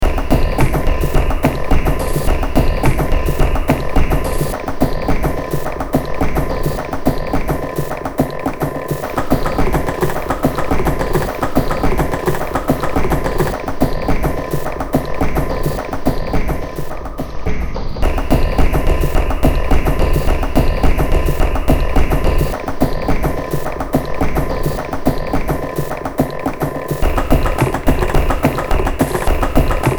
Music > Multiple instruments
Demo Track #3820 (Industraumatic)
Soundtrack, Horror, Sci-fi, Cyberpunk, Industrial, Games, Ambient, Noise, Underground